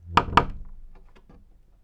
Sound effects > Objects / House appliances
Old cave door (opening side) XY - Random sound 1
Subject : Door sounds opening/closing Date YMD : 2025 04 22 Location : Gergueil France Hardware : Tascam FR-AV2 and a Rode NT5 microphone in a XY setup. Weather : Processing : Trimmed and Normalized in Audacity. Maybe with a fade in and out? Should be in the metadata if there is.
Dare2025-06A, Door, FR-AV2, hinge, indoor, NT5, Rode, Tascam, XY